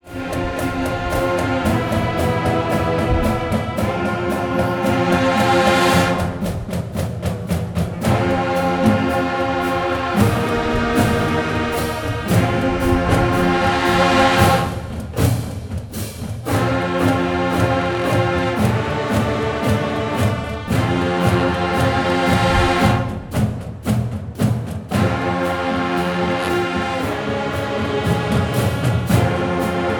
Music > Multiple instruments
Calentamiento de banda en San Salvador
Melodic sound. Warm up of El Salvador's largest young marching band. We can hear wind and percussion instruments.